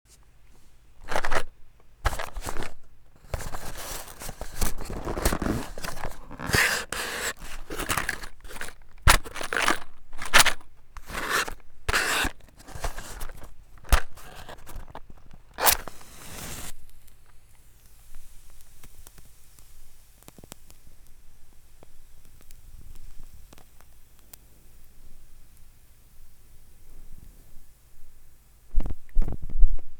Sound effects > Objects / House appliances
A series of lighting a wooden match, whipping it or blowing it out, and quenching it into a small bowl of water. I included lighting a candle twice for good measure. This is the dry, no-reverb sound.